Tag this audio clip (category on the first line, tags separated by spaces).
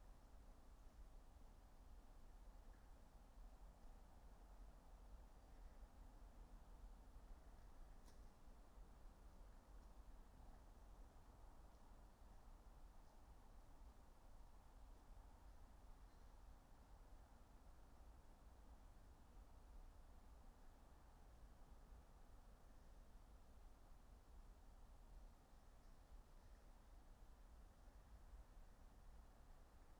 Soundscapes > Nature
nature Dendrophone data-to-sound modified-soundscape phenological-recording field-recording raspberry-pi sound-installation soundscape artistic-intervention